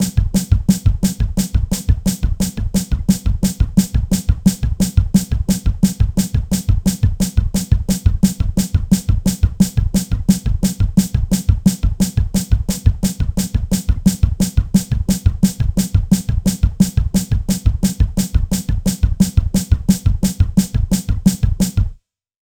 Instrument samples > Percussion
Simple Bass Drum and Snare Pattern with Weirdness Added 006
Noisy, Four-Over-Four-Pattern, Glitchy, Snare-Drum, FX-Drum, FX-Laden, FX-Drum-Pattern, Experiments-on-Drum-Beats, Fun, Silly, FX-Drums, Interesting-Results